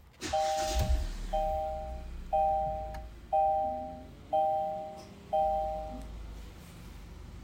Sound effects > Vehicles
car-engine-starting
Car vehicle engine starting with extra door opening beep indicator.